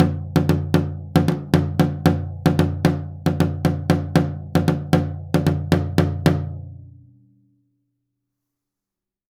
Music > Solo instrument
Toms Misc Perc Hits and Rhythms-011
Crash, Custom, Cymbal, Cymbals, Drum, Drums, FX, GONG, Hat, Kit, Metal, Oneshot, Paiste, Perc, Percussion, Ride, Sabian